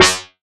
Instrument samples > Synths / Electronic
SLAPMETAL 2 Gb
additive-synthesis, fm-synthesis, bass